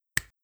Sound effects > Human sounds and actions
A sound effect of a single finger snap. Could come in handy for the right project. It only has been edited to remove background noise of either side of clip and also volume was amplified by 5db overall due to a quiet recording. Helps to say if you need to quit down or rise volume you know where the baseline is. Made by R&B Sound Bites if you ever feel like crediting me ever for any of my sounds you use. Good to use for Indie game making or movie making. Get Creative!
Click, Fingers, Snap